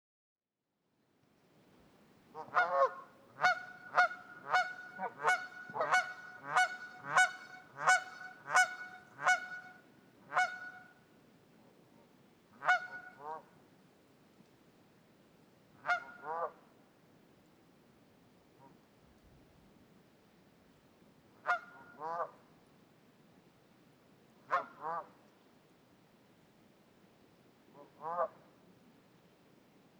Soundscapes > Nature
Argumentative Canada Geese, Spring Nesting in Western Alberta. April 4, 2025
Recorded April 4, 2025 1130 MDT at Beaver Flats Ponds west of Bragg Creek Alberta. About five pairs of newly returned Canada Geese attempt to establish and protect potential nesting area. 15° C, sunny, low winds. Recorded with Rode NTG5 supercardoid shotgun microphone in Movo blimp on pole, deadcat wind protection. Spectral denoise in Izotope RX10 was used to remove sound of water leaking over nearby beaver dam. Normalization, content cuts, and fades in/out. Thankyou!
geese, goose, honk, honking, nesting